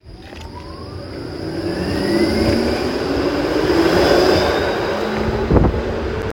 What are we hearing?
Soundscapes > Urban
Tram passing Recording 25
The sound comes from a tram moving along steel rails, produced mainly by wheel–rail contact, the electric drive, and braking systems. It is characterized by a low-frequency rolling rumble, and rhythmic rail noise with occasional high-pitched braking squeals as the tram passes. The recording was made outdoors near a tram line in Hervanta, Tampere, using recorder in iPhone 12 Pro Max. The purpose of the recording is to provide a clear example of a large electric vehicle pass-by for basic audio processing and movement-related sound analysis.
Tram, Rail, Train